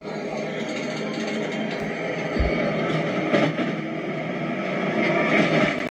Sound effects > Vehicles
tram sounds emmanuel 11
line, 23, tram